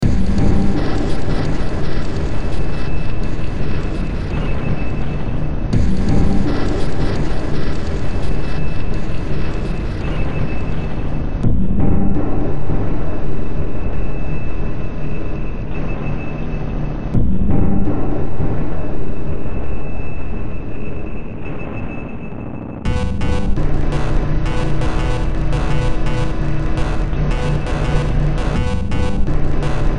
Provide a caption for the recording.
Music > Multiple instruments
Demo Track #3493 (Industraumatic)
Underground, Soundtrack, Industrial, Cyberpunk, Ambient, Noise, Horror, Sci-fi, Games